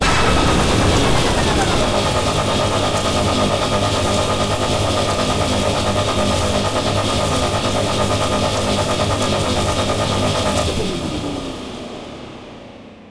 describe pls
Sound effects > Electronic / Design
A sci-fi engine designed to be turned on eventually and turned of at the end designed with Pigments via studio One